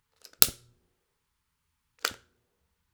Sound effects > Objects / House appliances
A lighter clicking on and off. Perhaps they light a fire. Perhaps a candle. You decide. Enjoy! Recorded on Zoom H6 and Rode Audio Technica Shotgun Mic.
gas, light